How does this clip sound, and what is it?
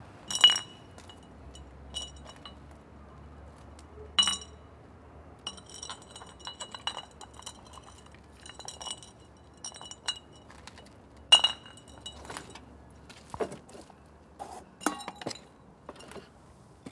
Sound effects > Objects / House appliances
Botellas cristal chocando
Percussive sound of glass bottles clinking.
field, Vaparaiso, America, recording